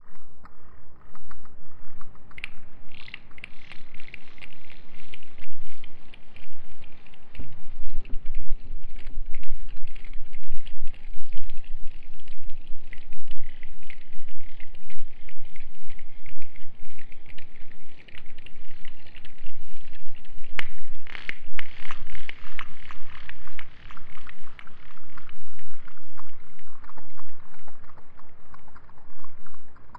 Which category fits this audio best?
Sound effects > Experimental